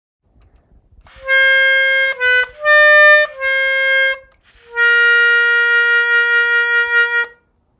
Music > Solo instrument
melodica melody
A Hohner Melodica Piano 27 playing a short melody